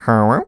Speech > Solo speech
Villager HUwU 2
Subject : A mid20s male voice-acting for the first time. Check out the pack for more sounds. Doing some "villager" type accent. Weather : Processing : Trimmed and Normalized in Audacity, Faded in/out. Notes : I think there’s a “gate” like effect, which comes directly from the microphone. Things seem to “pop” in. Also sorry my voice-acting isn’t top notch, I’m a little monotone but hey, better than nothing. I’l try to do better and more pushed acting next time ;) Tips : Check out the pack!
Cute, dialogue, FR-AV2, Human, Kawaii, Male, Man, Mid-20s, Neumann, NPC, Single-take, talk, Tascam, U67, UwU, Video-game, Villager, Vocal, Voice-acting